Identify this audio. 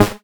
Instrument samples > Synths / Electronic
CINEMABASS 4 Ab
additive-synthesis, bass, fm-synthesis